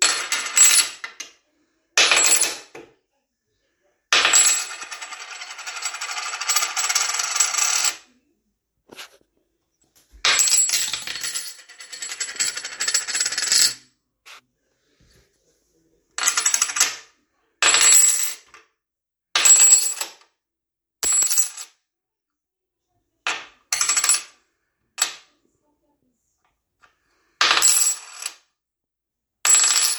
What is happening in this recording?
Sound effects > Objects / House appliances
OBJCoin Large, Drop, Spin Nicholas Judy TDC
A large coin dropping and spinning.